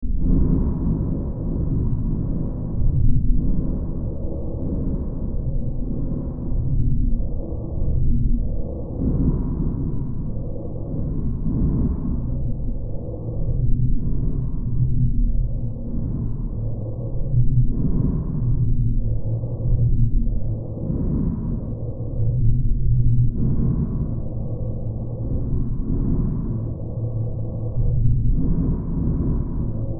Soundscapes > Synthetic / Artificial
Looppelganger #187 | Dark Ambient Sound
Use this as background to some creepy or horror content.
Drone, Soundtrack, Gothic, Sci-fi, Ambient, Ambience, Noise, Darkness, Games, Survival, Horror, Weird, Hill, Silent, Underground